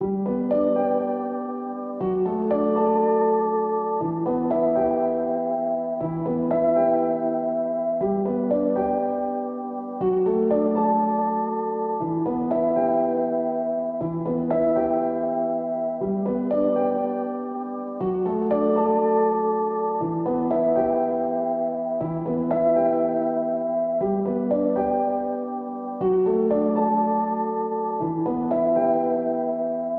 Music > Solo instrument
Piano loops 034 efect 4 octave long loop 120 bpm
loop, music, simple, pianomusic, free, 120bpm, simplesamples, piano, reverb, samples, 120